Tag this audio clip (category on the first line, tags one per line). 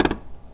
Sound effects > Other mechanisms, engines, machines
foley
handsaw
household
metal
percussion
plank
saw
sfx
shop
smack
tool
vibe
vibration